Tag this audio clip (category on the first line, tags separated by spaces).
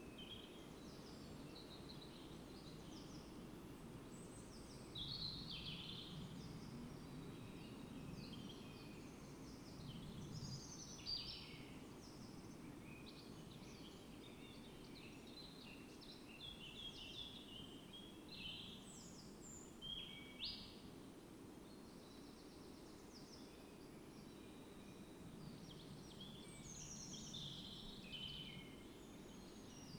Soundscapes > Nature
natural-soundscape phenological-recording data-to-sound raspberry-pi artistic-intervention field-recording sound-installation alice-holt-forest soundscape nature Dendrophone weather-data modified-soundscape